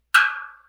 Sound effects > Objects / House appliances
aluminum can foley-016
alumminum can foley metal tap scrape water sfx fx household
household, alumminum, water, metal, sfx, scrape, tap, fx, can, foley